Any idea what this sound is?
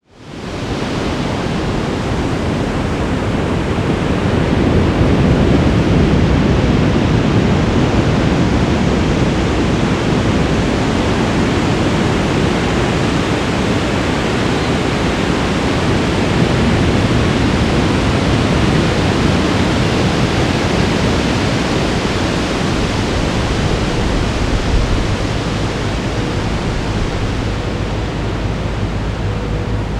Soundscapes > Other
Puerto ventanas mar y termoelectricas
360 degrees soundscape of Puerto Ventanas, next to the sea with a thermoelectric plant.
america, Chile, field, Machinery, recording, Sea, south, Valparaiso